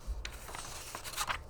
Sound effects > Objects / House appliances
OBJBook-Blue Snowball Microphone Book, Page, Turn 06 Nicholas Judy TDC
Turning a page of a book.
Blue-brand, Blue-Snowball, book, foley, page, turn